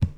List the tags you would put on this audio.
Sound effects > Objects / House appliances
bucket carry clang clatter cleaning container debris drop fill foley garden handle hollow household kitchen knock lid liquid metal object pail plastic pour scoop shake slam spill tip tool water

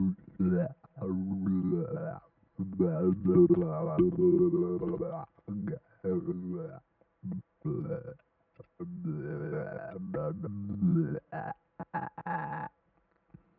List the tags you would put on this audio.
Sound effects > Human sounds and actions
deep recorded sample techno human

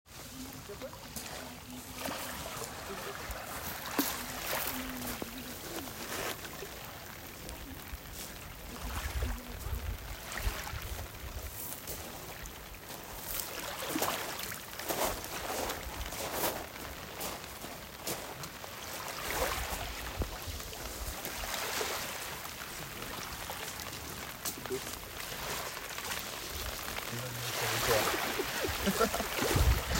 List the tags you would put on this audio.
Soundscapes > Nature
beach Calanques nature

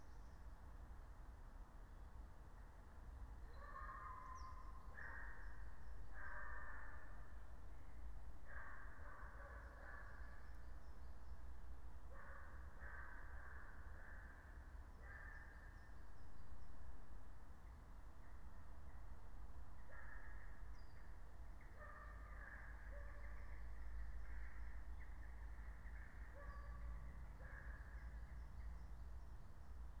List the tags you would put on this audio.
Soundscapes > Nature
field-recording meadow phenological-recording raspberry-pi soundscape natural-soundscape alice-holt-forest nature

